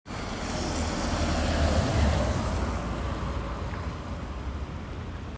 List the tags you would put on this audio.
Sound effects > Vehicles
rain tampere

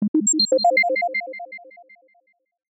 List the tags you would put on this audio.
Sound effects > Electronic / Design
alert confirmation digital interface message sci-fi selection

